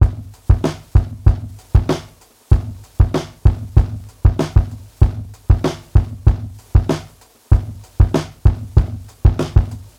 Music > Solo percussion
bb drum break loop dest 96
96BPM, Acoustic, Break, Breakbeat, Drum, DrumLoop, Drums, Drum-Set, Dusty, Lo-Fi, Vintage, Vinyl